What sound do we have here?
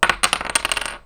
Sound effects > Objects / House appliances
FOLYProp-Blue Snowball Microphone, CU Seashell, Clatter 02 Nicholas Judy TDC
A seashell clattering.